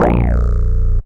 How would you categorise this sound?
Instrument samples > Synths / Electronic